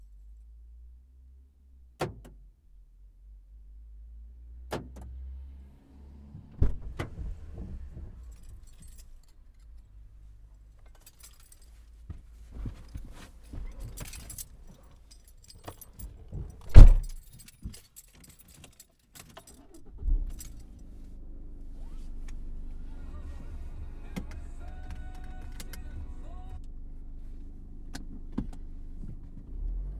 Soundscapes > Indoors
Interior POV of automatic diesel saloon car, 2011, quiet engine. Driver unlocks the car, enters the vehicle, starts the car and drives around town (Aylesbury) with pot holes, speed humps, stops at traffic lights etcetera before stopping and turning off the engine. Driver then exits the vehicle and locks the car.